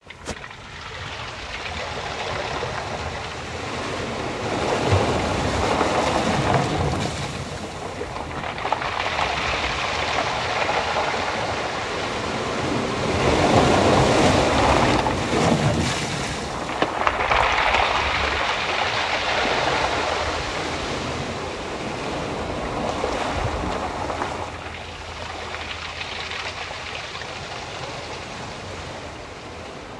Soundscapes > Nature
Waves on Wet Stones
Recording of a shoreline in Maine. Waves breaking and receding over a beach of smooth stones, creating an interesting rattling sound.
Beach, Ocean, Rocks, Shore, Stones, Tide, Waves